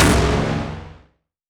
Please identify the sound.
Sound effects > Electronic / Design
Gunshot Digitized RoomReverb
Powerful and instantaneous gunshot from a highly technological weapon. Version with slight reverb.
bang, digitized, explosive, gun, impact, powerful, shot